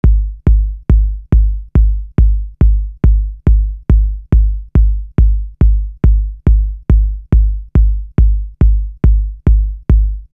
Music > Solo percussion
Ableton Live. VST......Fury-800......Kick 140 bpm Free Music Slap House Dance EDM Loop Electro Clap Drums Kick Drum Snare Bass Dance Club Psytrance Drumroll Trance Sample .
140, Bass, bpm, Clap, Dance, Drum, Drums, EDM, Electro, Free, House, Kick, Loop, Music, Slap, Snare